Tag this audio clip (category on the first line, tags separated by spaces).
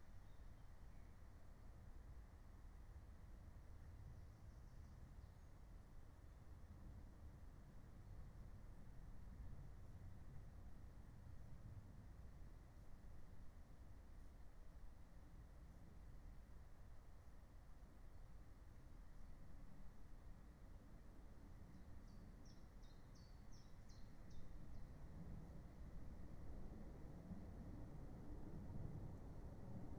Soundscapes > Nature
phenological-recording natural-soundscape nature raspberry-pi soundscape